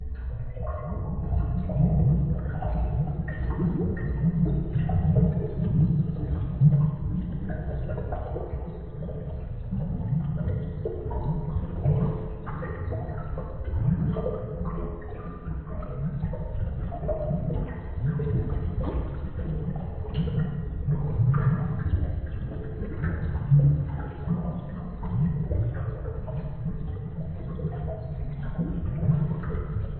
Sound effects > Natural elements and explosions
GEOLava-CU Lava Bubbles, Designed Nicholas Judy TDC
sound-design, lava, bubbles